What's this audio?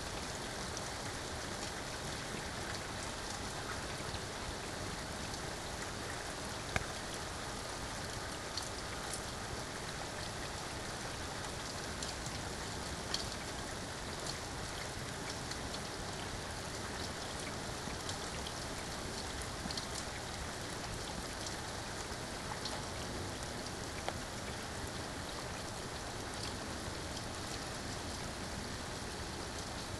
Sound effects > Natural elements and explosions
Rainy day
Rain sfx. Recorder: Ritmix RR-610